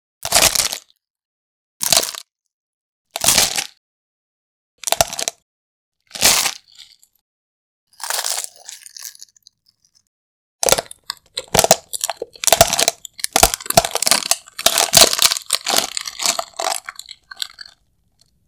Sound effects > Objects / House appliances
sounds of bones breaking with eggshell crackings used audacity playing with pitches and speed, but most importantly: playing with the EQ filter, the main element of adding bass boost to your sound designs.